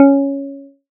Instrument samples > Synths / Electronic
APLUCK 1 Db
fm-synthesis, additive-synthesis, pluck